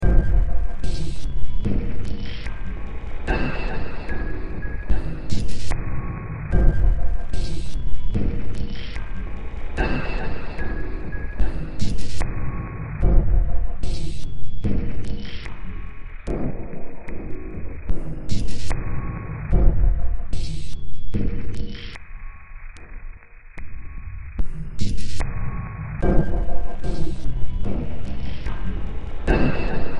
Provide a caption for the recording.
Music > Multiple instruments
Demo Track #3943 (Industraumatic)
Cyberpunk, Sci-fi, Underground, Soundtrack, Ambient, Horror